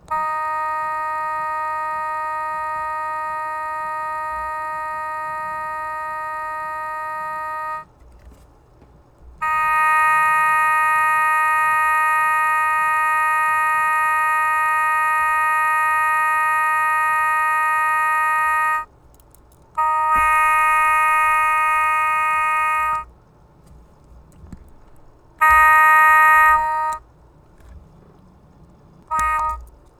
Music > Solo instrument
Various otamotone tones.